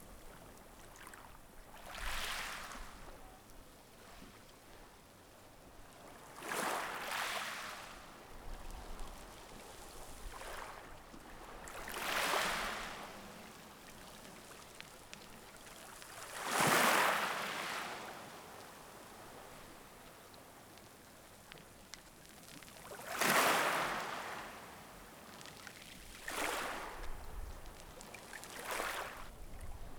Soundscapes > Nature
Gentle waves with pebbles moving
Gentle waves lapping on shore. Small pebbles are moving as the waves die out on the beach.
sea, gentle, waves, shifting